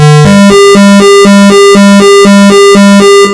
Sound effects > Electronic / Design
The Special Announcement tone for the Autonomous Facility Administration and Control System (AFACS). A quick half-second 150 Hz tone followed by a repeating tone that alternates between 200 and 400 Hz for 3 seconds with some delay, reverb, and dampening. Made in Audacity.
Alert, Audacity, Evacuation, Lu-Tech